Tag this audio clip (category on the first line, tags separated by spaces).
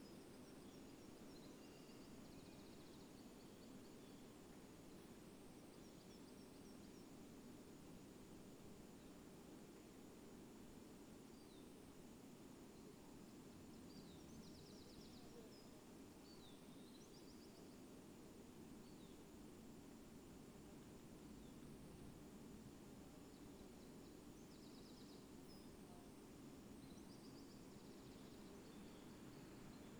Soundscapes > Nature
phenological-recording raspberry-pi nature sound-installation modified-soundscape weather-data natural-soundscape Dendrophone alice-holt-forest artistic-intervention data-to-sound soundscape field-recording